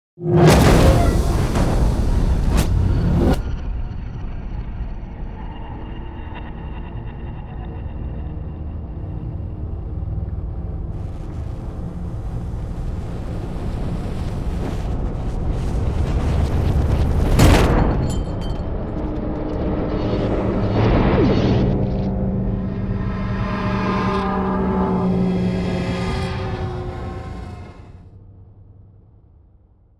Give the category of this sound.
Soundscapes > Other